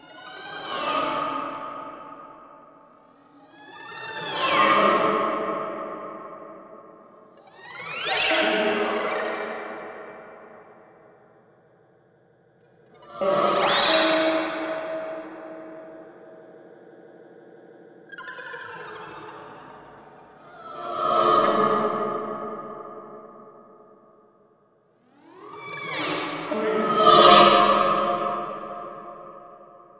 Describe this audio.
Sound effects > Electronic / Design
warped wave vox whooshers
Sequences of glitchy alien tones and fx created with Wavewarper 2 and other vst effects in FL Studio and further processed with Reaper
dark, ambience, analog, loopable, creature, monster, sci-fi, digital, fx, otherworldly, weird, machanical, machine, warped, sfx, soundeffect, wtf, bass, extraterrestrial, trippy, underground, synthetic, glitchy, glitch, experimental, gross, industrial, sweep, creepy, alien